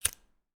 Sound effects > Objects / House appliances
Striking a yellow BIC lighter in a room next to a window on a cloudy day without rain